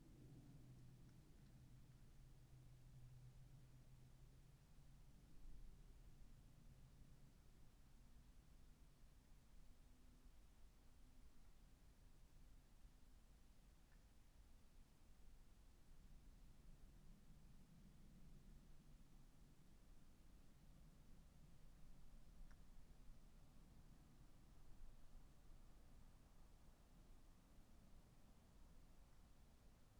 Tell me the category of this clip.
Soundscapes > Nature